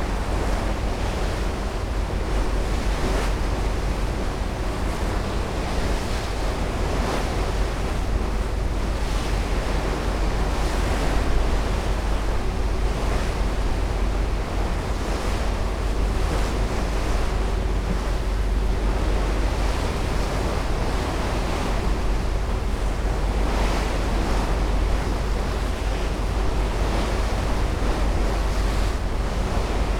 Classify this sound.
Sound effects > Other